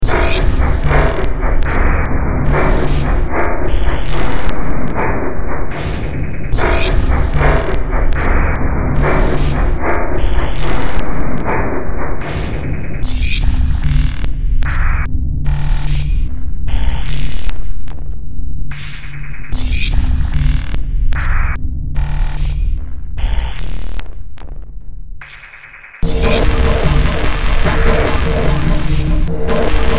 Music > Multiple instruments
Demo Track #3879 (Industraumatic)
Ambient, Cyberpunk, Games, Horror, Industrial, Noise, Sci-fi, Soundtrack, Underground